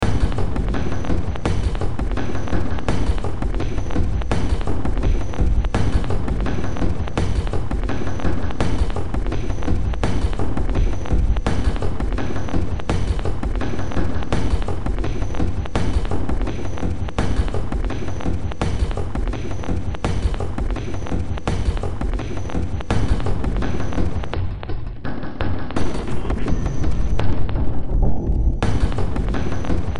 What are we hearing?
Music > Multiple instruments
Demo Track #3128 (Industraumatic)
Cyberpunk, Industrial, Horror, Sci-fi, Soundtrack, Ambient, Noise, Games, Underground